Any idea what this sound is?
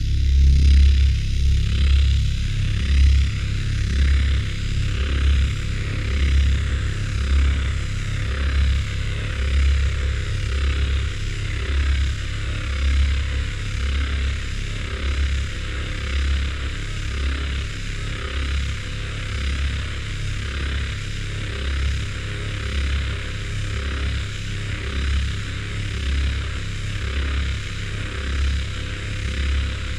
Soundscapes > Synthetic / Artificial
pressuring bassy sound that I accidentally got through hitting exceptionally high note on sytrus inferno preset and equalizing the sound I got